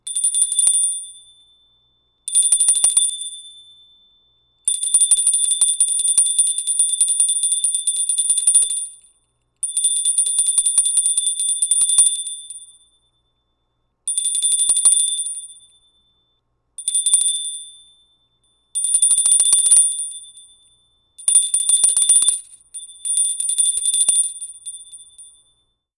Sound effects > Objects / House appliances
BELLHand-Blue Snowball Microphone, CU Small, Metal, Ringing Nicholas Judy TDC
A small metal handbell ringing.
metal, hand, ring, bell, small, Blue-brand, handbell, Blue-Snowball